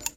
Sound effects > Objects / House appliances
FOLYProp-Samsung Galaxy Smartphone, CU Baby Seat Belt in Cart, Snap Shut Nicholas Judy TDC
A baby seat belt snapping shut in cart. Recorded at Goodwill.